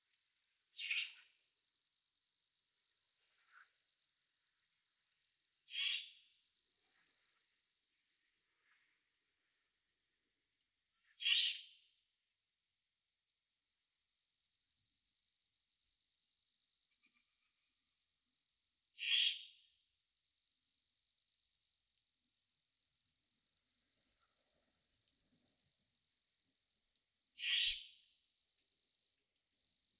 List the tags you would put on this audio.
Soundscapes > Nature
field-recording
owl

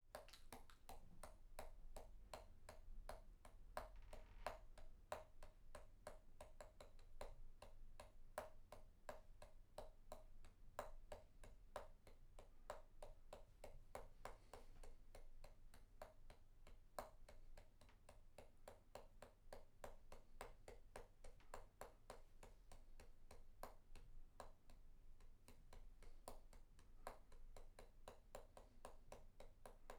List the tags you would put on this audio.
Sound effects > Human sounds and actions
individual
person

